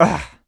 Speech > Solo speech
Hurt - Agh
Agh, dialogue, FR-AV2, Human, Hurt, Male, Man, Mid-20s, Neumann, NPC, oneshot, pain, singletake, Single-take, talk, Tascam, U67, Video-game, Vocal, voice, Voice-acting